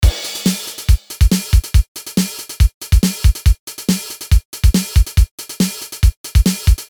Music > Solo percussion
A drum loop with a Linn Drumset sound.